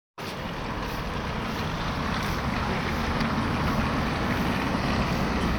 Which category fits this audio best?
Soundscapes > Urban